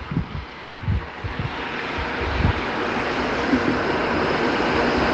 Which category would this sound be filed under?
Sound effects > Vehicles